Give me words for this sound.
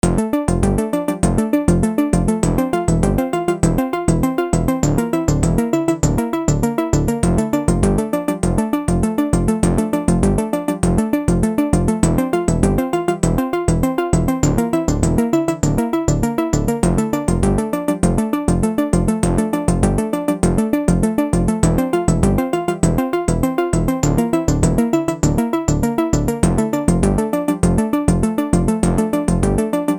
Music > Multiple instruments
Musical Composition
Ableton Live. VST.....Imposcar, Surge.Musical ...Composition Free Music Slap House Dance EDM Loop Electro Clap Drums Kick Drum Snare Bass Dance Club Psytrance Drumroll Trance Sample .
Drum, Musical, Composition, EDM, Dance, Bass, Snare, Music, Clap, Electro, House, Loop, Drums, Slap, Free, Kick